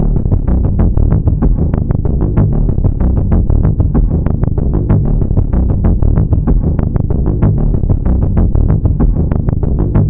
Instrument samples > Synths / Electronic
This 190bpm Synth Loop is good for composing Industrial/Electronic/Ambient songs or using as soundtrack to a sci-fi/suspense/horror indie game or short film.
Samples, Loop, Packs, Drum, Underground, Industrial, Ambient, Alien, Loopable, Weird, Soundtrack, Dark